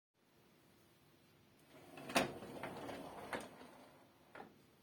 Sound effects > Objects / House appliances
Opening a drawer
close, door, drawer, furniture, office, open, opening, slide, wardrobe, wooden